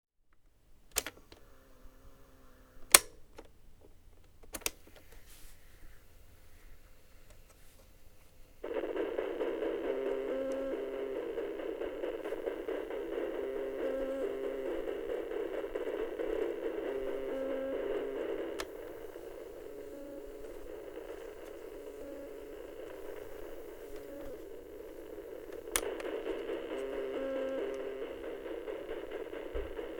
Sound effects > Objects / House appliances
tape cassette deck sounds, droning music - panasonic RR-830
About a minute of sounds with a Panasonic RR-830 tape player. Pressing some buttons, playing a droning piece of music I made, fast-forwarding it, speeding it up, changing the tape, rewinding it, and so on. Recorded from a couple inches away with a Zoom H5. The droning music is not a real song or sample, I made it in FL Studio. fast fowarding, reversing, speeding up, etc
buttons, cassette-tape, fast-forwarding, fiddling, hardware, noises, panasonic, presses, retro, rr-830, tape, tape-recording, tinkering